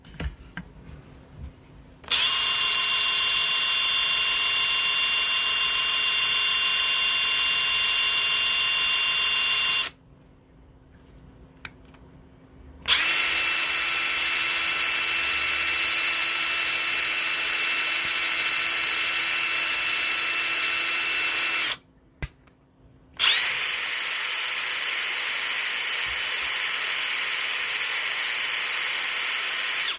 Sound effects > Objects / House appliances
TOOLPowr-Samsung Galaxy Smartphone, CU Impact Wrench, Start, Run, Stop, Three Speeds Nicholas Judy TDC
An impact wrench starting, running and stopping in three speeds.
run, start, stop, Phone-recording, speed, impact-wrench